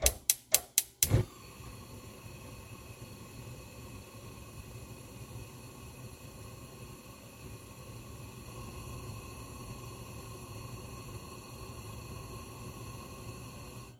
Sound effects > Objects / House appliances
A gas stove igniting.